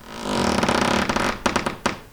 Sound effects > Objects / House appliances
Creaking Floorboards 11
bare-foot, creaking, creaky, floor, floorboard, floorboards, flooring, footstep, footsteps, going, grate, grind, groan, hardwood, heavy, old, old-building, room, rub, scrape, screech, squeak, squeaking, squeaky, squeal, walk, walking, weight, wood, wooden